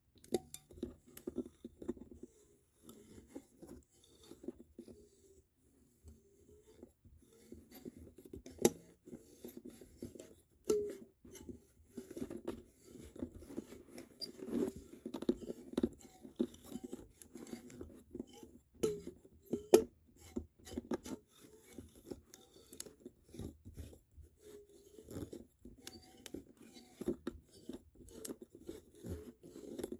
Sound effects > Objects / House appliances
TOYMisc-Samsung Galaxy Smartphone Etch A Sketch, Sketching, Knobs Turning Nicholas Judy TDC
An etch-a-sketch with someone sketching and turning knobs.